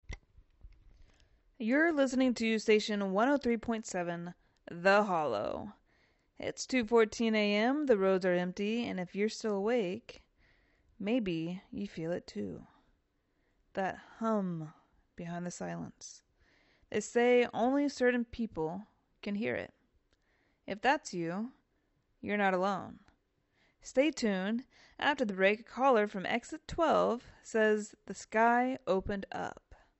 Speech > Solo speech
“Late Night Signal” (radio host / mysterious broadcast / liminal vibes)
A surreal, haunting radio host message for late-night liminal vibes—ideal for analog horror, ARGs, or eerie scene intros.
lateNightScriptscript, mysterybroadcast, radioshow, script